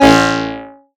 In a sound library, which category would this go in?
Instrument samples > Synths / Electronic